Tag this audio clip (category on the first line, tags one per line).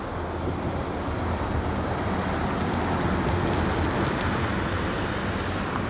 Sound effects > Vehicles
vehicle
engine
bus